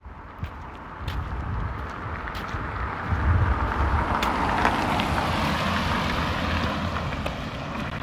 Soundscapes > Urban
Car passing Recording 9
The sound originates from a passenger car in motion, generated by the engine and tire–road interaction. It consists of continuous engine noise and tire friction, with a noticeable Doppler change as the car approaches and passes the recording position. The sound was recorded on a residential street in Hervanta, Tampere, using a recorder in iPhone 12 Pro Max. The recording is intended for a university audio processing project, suitable for simple analysis of pass-by sounds and spectral changes over time.
Cars, Road, Transport